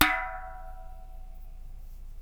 Sound effects > Other mechanisms, engines, machines
shop foley-014
bop, sound, strike, thud